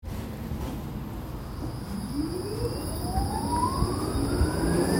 Sound effects > Vehicles
Tram driving near station at low speed in Tampere. Recorded with iphone in fall, humid weather.